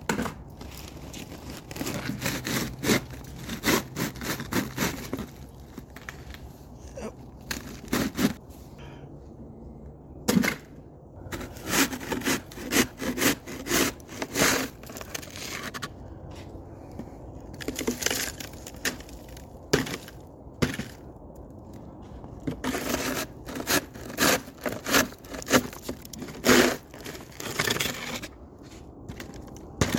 Sound effects > Objects / House appliances
Someone shovelling snow.